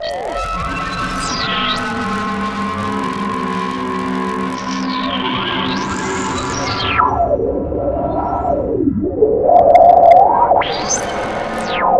Sound effects > Electronic / Design
Roil Down The Drain 19
PPG-Wave, dark-soundscapes, sci-fi, scifi, vst, content-creator, noise, science-fiction, sound-design, dark-design, horror, dark-techno, drowning, noise-ambient, mystery, cinematic